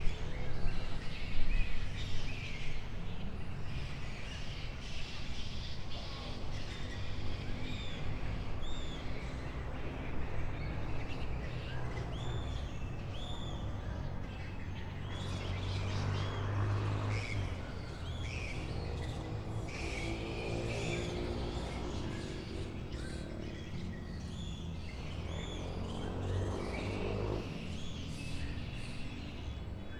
Soundscapes > Urban
250729 175806 PH Hundreds of flying foxes
Hundreds of flying foxes (probably ‘acerodon jubatus’) at Puerto Galera. (take 2) I made this recording at dusk, in Puerto Galera (Oriental Mindoro, Philippines) while hundreds (maybe thousands ?) flying foxes were screaming and starting to fly. In the background, one can hear the hum from the town, and some human voices. Recorded in July 2025 with a Zoom H5studio (built-in XY microphones). Fade in/out applied in Audacity.
acerodon-jubatus, ambience, atmosphere, bat, bats, field-recording, flying-fox, flying-foxes, hum, Philippines, Puerto-Galera, scream, screaming, soundscape, suburban, town, voices